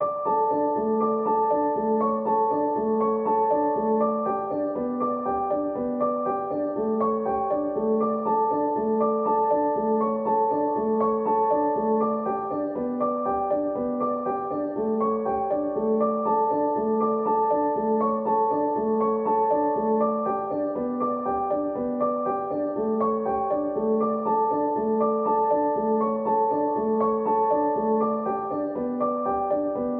Music > Solo instrument
Piano loops 198 octave short loop 120 bpm
120, free, pianomusic, reverb